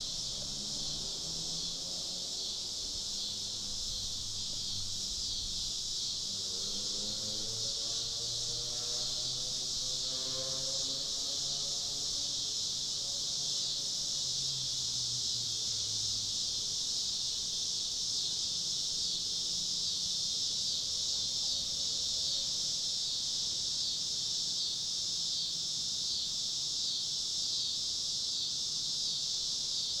Soundscapes > Nature
Evening Ambience with Cicadas
Field recording of cicadas with light traffic in Gaithersburg, Maryland. Recorded using a RODE microphone and TASCAM recorder in August 2023.
cicadas, field-recording, summer, nature, rode, tascam, evening